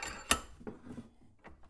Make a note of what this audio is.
Sound effects > Other mechanisms, engines, machines
metal shop foley -008
knock, crackle, little, pop, metal, boom, percussion, sound, foley, perc, bop, bang, fx, thud, tink, rustle, tools, strike, oneshot, wood, bam, sfx, shop